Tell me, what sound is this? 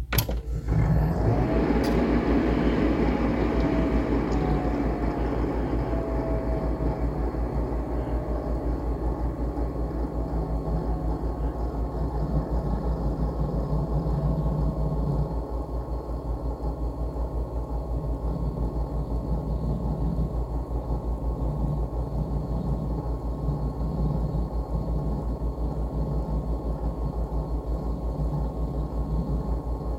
Sound effects > Other mechanisms, engines, machines
CMPTDriv PC Turns On, Running, Turns Off Nicholas Judy TDC
A PC turning on, running and turns off.
pc, Phone-recording, run, turn-off, turn-on